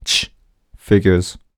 Speech > Solo speech
Annoyed - Tshh figures
voice, Voice-acting, singletake, Male, FR-AV2, oneshot, Neumann, upset, dialogue, Mid-20s, Video-game, Human, Man, annoyed, U67, Vocal, Tascam, NPC, grumpy, talk, Single-take